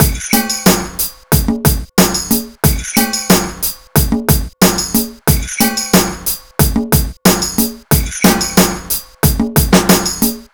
Music > Other
FL studio 9 pattern construction